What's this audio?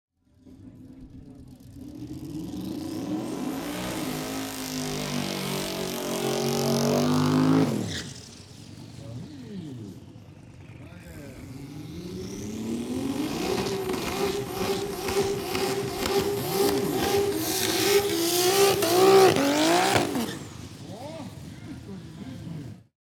Soundscapes > Other
Wildcards Drag Race 2025
Wildcards Drag Race, which took place on August 17, 2025, in Estonia, on the grounds of the former military airfield in Klitsi. I carried out several tests with different microphones and various setups. This particular clip was made using one specific configuration.
2025, Drag, Race